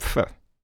Speech > Solo speech
Annoyed - Pffe 2
Man, talk, Tascam, NPC, upset, FR-AV2, Single-take, Neumann, annoyed, U67, Voice-acting, Vocal, Video-game, Human, voice, Mid-20s, oneshot, grumpy, dialogue, Male, singletake